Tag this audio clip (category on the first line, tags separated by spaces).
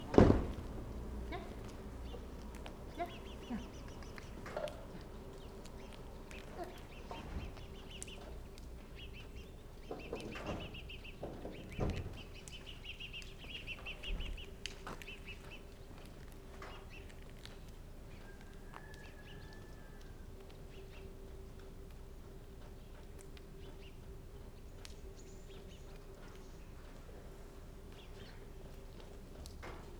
Soundscapes > Urban
21410 ambience Cote-dor country-side field-recording France FR-AV2 Gergueil Hypercardioid mke-600 mke600 NT5 Omni Rode rural shotgun-mic Tascam